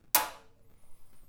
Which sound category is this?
Sound effects > Other mechanisms, engines, machines